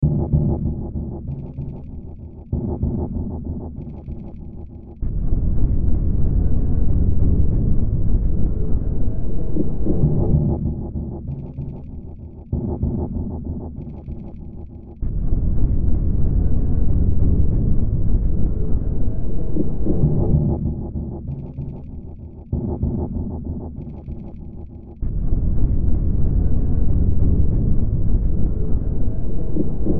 Soundscapes > Synthetic / Artificial
Use this as background to some creepy or horror content.